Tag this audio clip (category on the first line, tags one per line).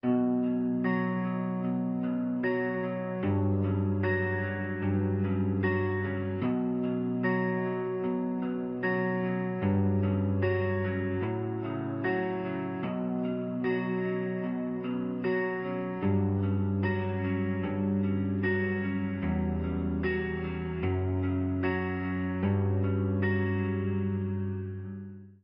Music > Other
BM
depressive
electric
guitar
sample